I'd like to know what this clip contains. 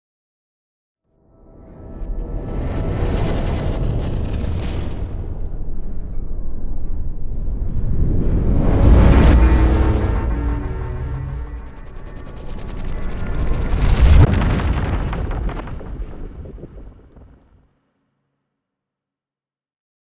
Sound effects > Other
Sound Design Elements SFX PS 080
riser, trailer, epic, movement, cinematic, effect, metal, sub, impact, reveal, indent, sweep, industrial, whoosh, stinger, game, video, implosion, boom, transition, hit, deep, explosion, bass, tension